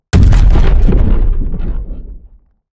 Sound effects > Other
Sound Design Elements Impact SFX PS 101
A powerful and cinematic sound design impact, perfect for trailers, transitions, and dramatic moments. Effects recorded from the field. Recording gear-Tascam Portacapture x8 and Microphone - RØDE NTG5 Native Instruments Kontakt 8 REAPER DAW - audio processing
hard, crash, collision, blunt, transient, heavy, smash, force, bang, impact, game, sharp, percussive, hit, audio, design, thud, cinematic, shockwave, strike, rumble, sound, power, effects, explosion, sfx